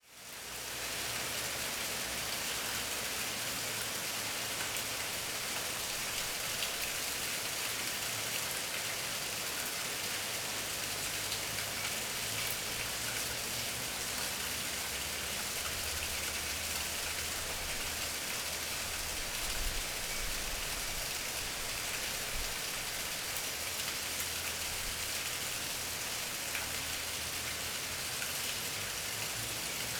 Soundscapes > Nature
Light rain can be heard falling—some distant traffic.